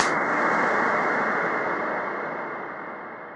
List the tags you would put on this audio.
Sound effects > Electronic / Design
bash
bass
brooding
cinamatic
combination
crunch
deep
explode
explosion
foreboding
fx
hit
impact
looming
low
mulit
ominous
oneshot
perc
percussion
sfx
smash
theatrical

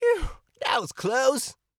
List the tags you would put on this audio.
Speech > Solo speech
Dude
English-language
France
one-shot
RAW
Single-mic-mono
Tascam
that-was-close

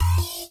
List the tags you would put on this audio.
Sound effects > Electronic / Design
alert
button
Digital
interface
menu
notification
options
UI

